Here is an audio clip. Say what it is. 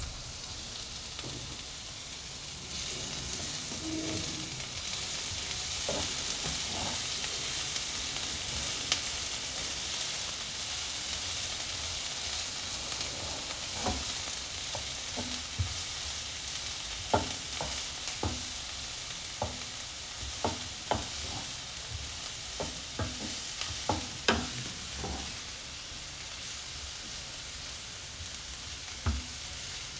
Sound effects > Human sounds and actions
FOODCook fry pan frying MPA FCS2
frying ingredients in a pan
frying, oil, pan